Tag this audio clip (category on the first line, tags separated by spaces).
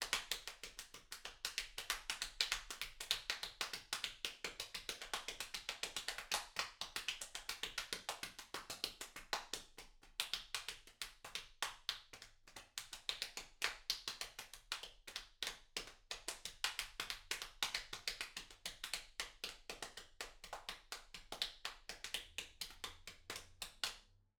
Sound effects > Human sounds and actions
Applaud Applauding Applause AV2 clap clapping FR-AV2 individual indoor NT5 person Rode solo Solo-crowd Tascam XY